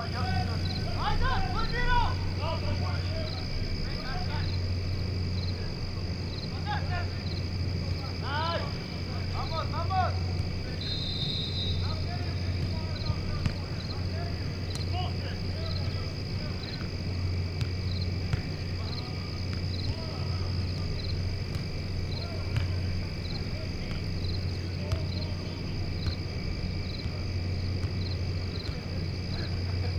Soundscapes > Urban
An Outdoor Evening College Soccer Practice. Background ambiance. High school. College. Night practice. Sports. A couple makes out near the soccer practice. Someone spies on a player. A drug deal under the bleachers. Anything you want! You're welcome! :) Recorded on Zoom H6 and Rode Audio Technica Shotgun Mic.